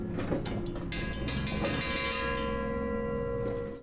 Sound effects > Objects / House appliances
Junkyard Foley and FX Percs (Metal, Clanks, Scrapes, Bangs, Scrap, and Machines) 87
Bash, rattle, garbage, Clank, FX, Metal, Metallic, Environment, SFX, Percussion, tube, Robotic, waste, Perc, Dump, Bang, Clang, Atmosphere, Smash, dumping, Foley, trash, dumpster, scrape, Junk, Ambience, Machine, rubbish, Junkyard, Robot